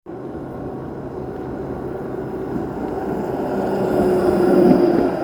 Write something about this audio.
Soundscapes > Urban
voice 8 18-11-2025 tram
Rattikka, Tram, TramInTampere